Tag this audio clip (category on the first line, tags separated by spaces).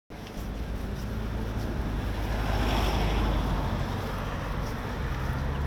Sound effects > Vehicles
Car Field-recording Finland